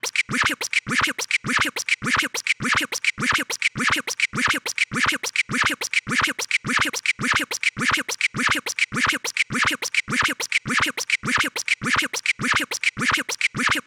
Sound effects > Electronic / Design
3. forma / shape
This sound has been edited and processed from the original recording.
loop, scratch, soundfx